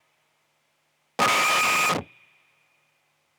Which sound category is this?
Music > Other